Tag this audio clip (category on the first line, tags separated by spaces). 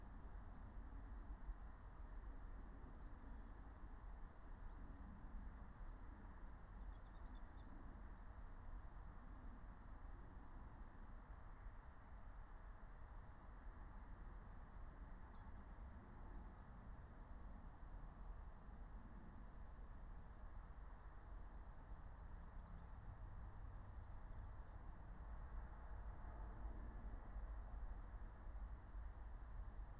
Soundscapes > Nature
field-recording natural-soundscape soundscape raspberry-pi phenological-recording meadow alice-holt-forest nature